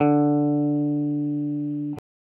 Instrument samples > String
Random guitar notes 001 D3 06
electric, electricguitar